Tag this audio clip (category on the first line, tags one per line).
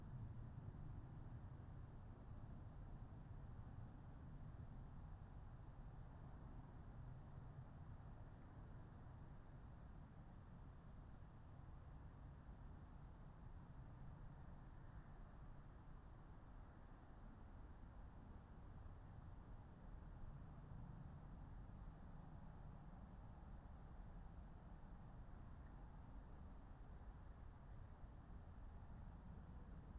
Soundscapes > Nature
Dendrophone
weather-data
alice-holt-forest
raspberry-pi
natural-soundscape
modified-soundscape
phenological-recording
nature
sound-installation
soundscape
data-to-sound
artistic-intervention
field-recording